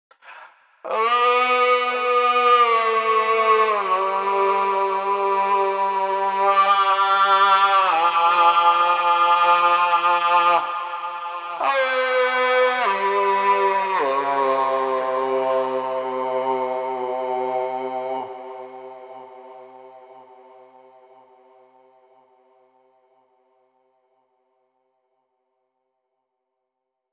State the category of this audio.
Speech > Other